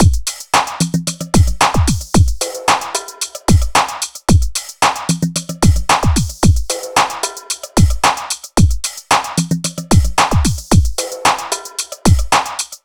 Music > Solo percussion
Just some beats I made in g-stomper on a phone.
EDM, Beat, Chill